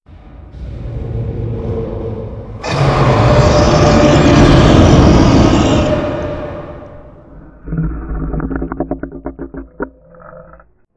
Sound effects > Animals
Deep sea creature / kraken roar created in Audacity. Built from multiple layered sounds (pitch-shifted growls, low rumbles, and processed animal/voice elements) to make a huge underwater monster scream, similar to a kraken or SCP-3000 in the deep ocean. Edited and designed entirely in Audacity using heavy EQ, echo, reverb, distortion, and time-stretching to get a slow, massive, distant feel, as if it’s calling from far below the surface. Extra low-end boosted for a deep, rumbling impact, with subtle watery texture to sell the undersea vibe. Good for horror games, underwater monsters, deep-sea atmospheres, SCP-style creatures, and dark ambient soundscapes. Use responsibly—may induce existential dread!